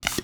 Sound effects > Objects / House appliances
Recording of a person blowing into a straw, loaded with a spitball and firing it
blowing, Straw, shot, Spitball, blow, foley